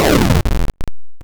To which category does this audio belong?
Sound effects > Electronic / Design